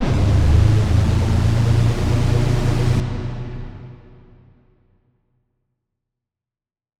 Sound effects > Electronic / Design
A very intense sample from playing around with TAL-PHA, emphasising an abstract sound between alien ships landing or taking off, could be also used in a manner of something charging up.
alien, effect, invasion, sci-fi, space, stereo, synth